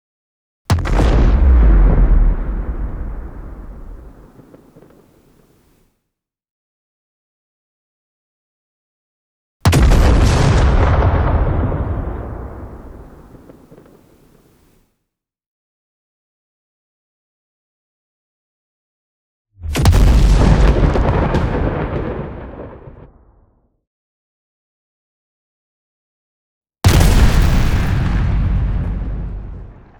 Sound effects > Natural elements and explosions
Custom explosion sound part 3 fate staynight 10172025
destruction, dynamite, large, cannon, slam, bassy, slappy, noise, artillery, slap, boom, tnt, kaboom, grenade, loud